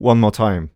Speech > Solo speech
One more time 3
chant, dry, FR-AV2, hype, Male, Man, Mid-20s, more, Neumann, one, oneshot, raw, singletake, Single-take, Tascam, time, U67, un-edited, Vocal, voice